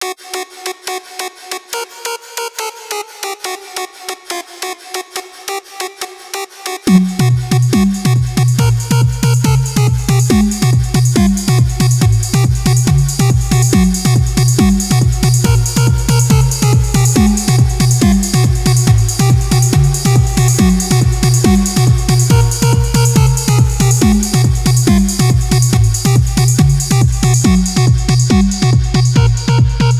Music > Multiple instruments
Bumpin' Beat Perseus Sublab Microtonic Lead and Bass Loop 140bpm
A bumpin bassy techno edm loop made with Perseus, Microtonic and Sublab in FL Studio, Processed with Reaper
acid, bass, bassloop, beat, bounce, break, club, dance, drop, drum, dub-step, edm, electro, electronic, hard, house, kit, kitloop, lead, loop, loopable, melody, microtonic, perseus, rave, synth, techno, trance